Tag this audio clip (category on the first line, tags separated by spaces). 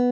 Instrument samples > String
arpeggio cheap tone sound guitar design stratocaster